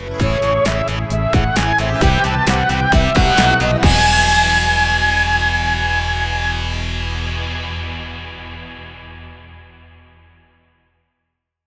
Music > Multiple instruments
Don't think it's particularly good compared with my more recent current stuff, but perhaps someone will find good use of it.